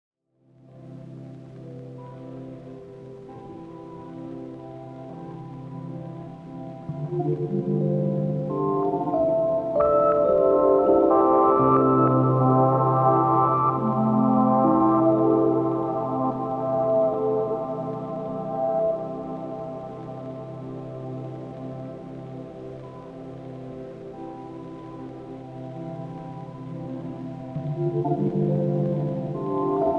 Music > Other
My memories fade faster and faster now.
tape, ambient, glitch, melody, lost, loop, lofi, hiss, dreamy